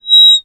Sound effects > Experimental
Subject : Larsen / feedback noise. Close-up earphones/mic larsen. Date YMD : 2025 04 probably Location : Saint assiscle France Hardware : Koss KSC75 Tascam FR-AV2, Rode NT5 Weather : Processing : Trimmed in Audacity.